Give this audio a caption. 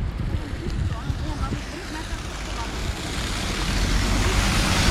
Sound effects > Vehicles
Bus volume rising as it slowly leaves awaya from mic, recorded with iphone 8
brakes, tires, Bus